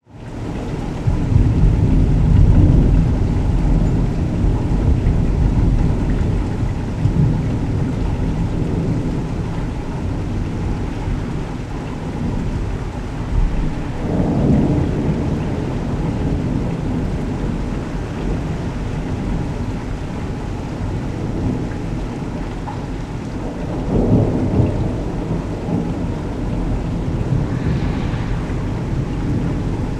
Soundscapes > Nature
City Thunders Collage One
A collage of thunder recordings, made from different moments of a thunderstorm over the city. Perfect for background sound, put it on a loop to sleep with, sound design for bgs, etc. Recorded with Pair Clippy Omni mics Zoom F3 Field Recorder